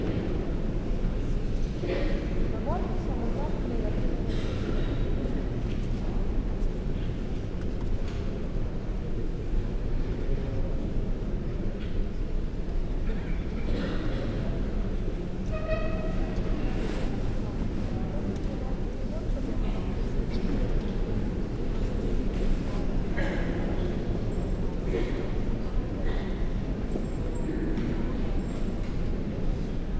Soundscapes > Indoors
Christmas mass: recorded 12-24-25 with mixpre 6 mkii and low microphones location Milano, italy
church, people, voices